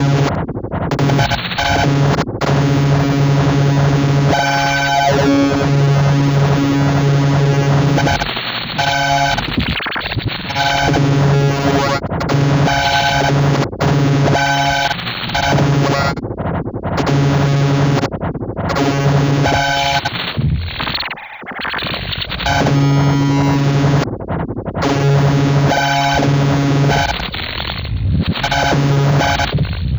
Sound effects > Electronic / Design
Synthed with phaseplant only. Sample used from 99Sounds.